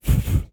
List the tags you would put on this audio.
Sound effects > Other
burn
effect
status

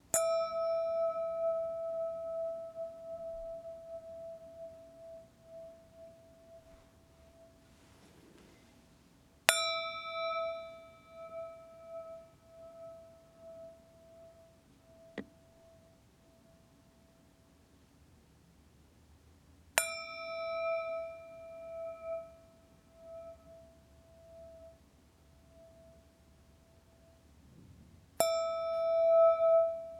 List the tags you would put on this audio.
Sound effects > Objects / House appliances
doppler
resonance
foley

